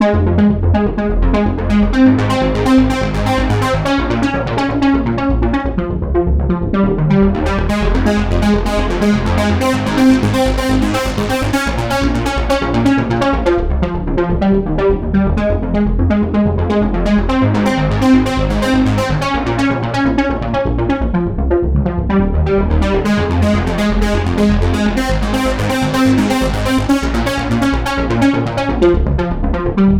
Music > Solo instrument
synth ARP escape from boston dynamics Fmin 125
he made it out 🦾🤖 trance-y, synthwave, outrunner type arp created with analog lab v and ableton. 125 BPM. F minor.
125, arp, synth, trance, wave